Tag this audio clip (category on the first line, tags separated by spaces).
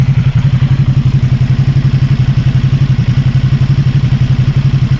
Sound effects > Other mechanisms, engines, machines
Ducati Supersport Motorcycle